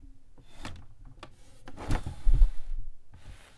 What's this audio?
Sound effects > Objects / House appliances
Wooden Drawer 04
drawer, open, wooden